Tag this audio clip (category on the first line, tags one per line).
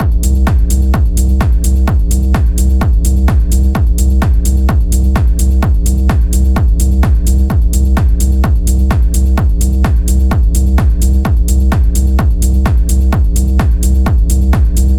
Instrument samples > Percussion
128bpm
audacity
bass
flstudio
kick
loop
sample
techno
tops